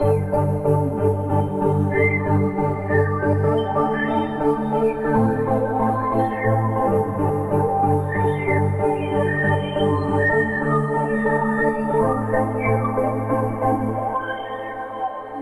Music > Other
Ambient Synthetic Melodyloop
ambient, chill, loop, synth